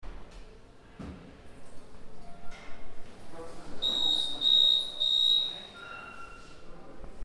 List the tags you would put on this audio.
Sound effects > Other mechanisms, engines, machines
alarm bookalarm library secucity

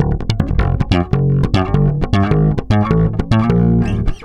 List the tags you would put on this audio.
Instrument samples > String
bass blues funk fx loops mellow oneshots plucked riffs rock

